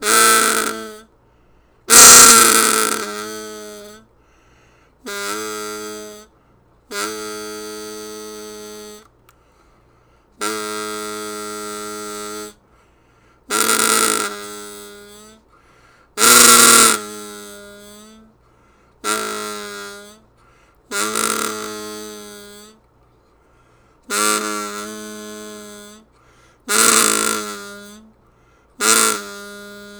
Sound effects > Objects / House appliances
A fart whistle or a low pitched party horn.